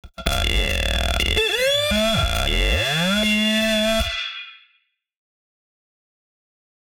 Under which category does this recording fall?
Sound effects > Electronic / Design